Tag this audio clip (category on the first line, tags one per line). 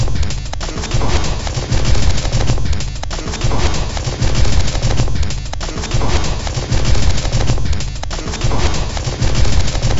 Instrument samples > Percussion
Alien
Ambient
Dark
Drum
Industrial
Loop
Loopable
Packs
Samples
Soundtrack
Underground
Weird